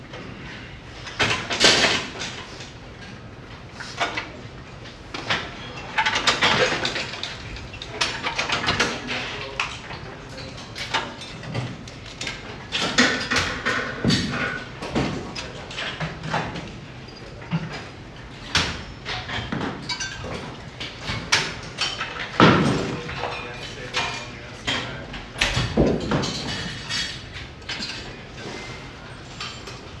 Soundscapes > Indoors
Gym machines and weights
This was recorded with an iPhone 14 Pro Recorded at the gym. Lots of sounds of machines and weights dropping, sounds like a warehouse or harbor.
loud, metallic, annoying